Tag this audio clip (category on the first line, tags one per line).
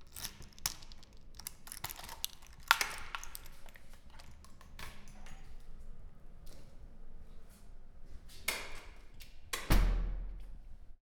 Sound effects > Objects / House appliances
basement
closing
door
doors
opening